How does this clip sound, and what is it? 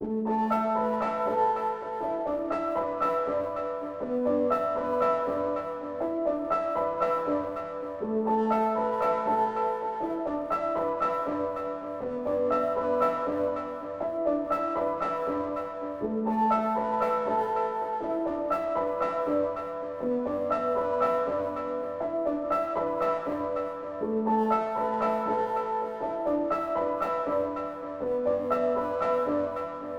Music > Solo instrument
Piano loops 189 efect 3 octave long loop 120 bpm
loop, reverb, pianomusic, simplesamples